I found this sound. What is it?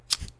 Sound effects > Other
LIGHTER FLICK 1
LIGHTER, SMOKER